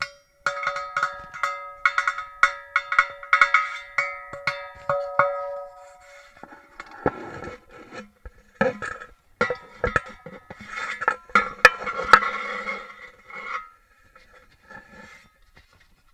Sound effects > Experimental
Tapping on and moving around an empty thermos with a contact microphone inside